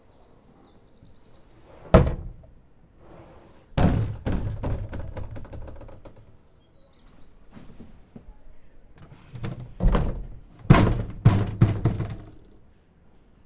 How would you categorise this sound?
Sound effects > Other mechanisms, engines, machines